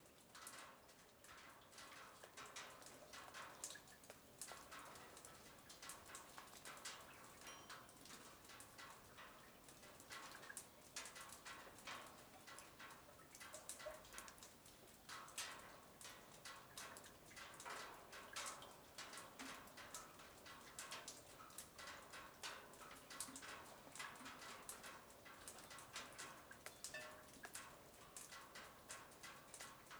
Soundscapes > Urban
Rain scaffolding lower
A mild rain falling on a scaffolding, more ambient recording. Raindrops hitting the metallic / aluminum structure. Rain is starting again at +/- 3'. In the background, some people walking on a gravel path. 2 x EM272 Micbooster mics, Tascam FR-AV2
dripping, echafaudage, water, drops, scaffolding, field-recording, pluie, weather, rain, metal, wet, drip, raindrops